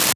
Instrument samples > Synths / Electronic
databent open hihat 3
A databent open hihat sound, altered using Notepad++
databending, glitch, hihat, percussion